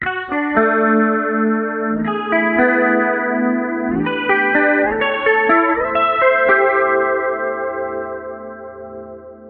Music > Solo instrument
A versatile and professional bass guitar tone shaped by AmpliTube 5. At its core is the New York 8750 bass amplifier model (based on the Aguilar DB750), delivering a rich, full low-end from pristine clean to a warm, articulate drive. The signal chain is pre-conditioned with a noise gate for a clean, silent input, followed by a DI box for optimal impedance and signal quality. A compressor pedal then tames dynamics, adding punch and sustain. This setup provides a studio-ready, powerful bass sound suitable for rock, funk, jazz, pop, and metal, perfect for tracks needing a defined and controlled bass foundation.